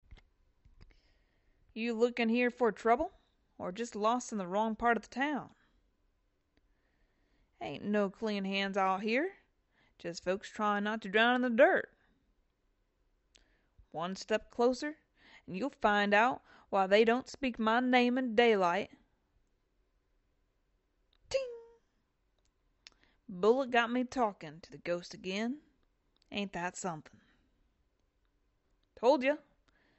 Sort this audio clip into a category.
Speech > Solo speech